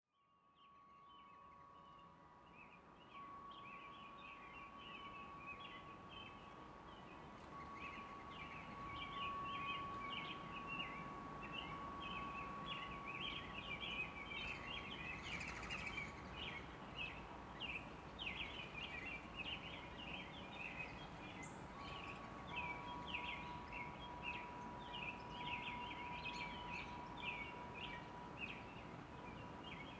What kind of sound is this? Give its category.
Soundscapes > Nature